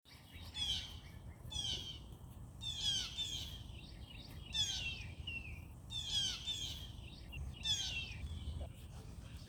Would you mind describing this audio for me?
Sound effects > Animals
Recorded this blue jay in my back yard with a Samsung Galaxy S23+ using the mobile app WaveEditor and finessed with Adobe Audition.
birdsounds, songbirds, bluejay, backyard, birdsongs, alabama, nature, birds